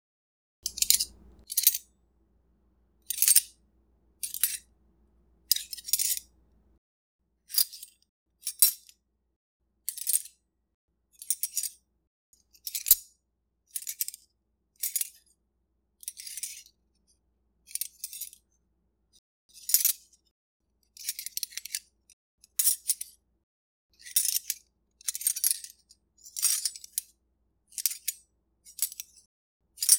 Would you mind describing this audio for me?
Sound effects > Objects / House appliances
heavens feel true assassin knife handling sound 01162026
Sounds of knife weapon handling sounds inspired by heavens feel movie. recorded from silverware spoon and fork handling and sliding one another. can work for robot characters with metal clawed hands.